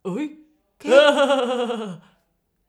Sound effects > Human sounds and actions
Riure, Laughs
Català Rialles. English. Laughs
cartoony, foley, Laughs, sounddesign, vocal